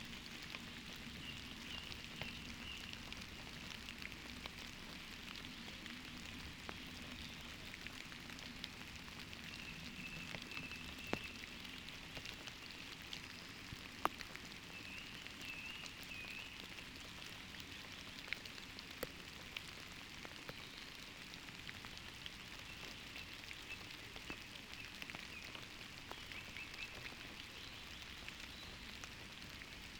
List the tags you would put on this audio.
Soundscapes > Nature
phenological-recording
sound-installation
data-to-sound
field-recording
raspberry-pi
alice-holt-forest
Dendrophone
artistic-intervention
modified-soundscape
natural-soundscape
nature
soundscape
weather-data

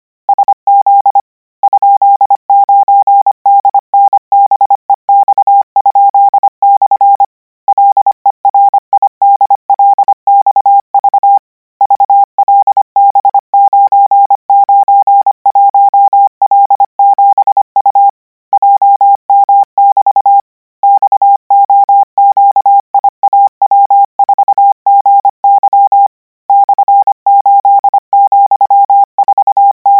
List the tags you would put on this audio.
Sound effects > Electronic / Design
characters
code

